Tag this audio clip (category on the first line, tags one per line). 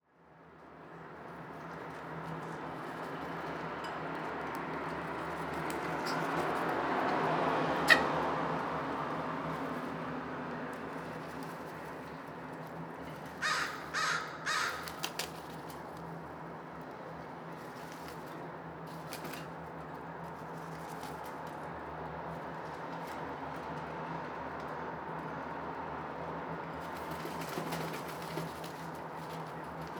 Soundscapes > Urban
birds
wings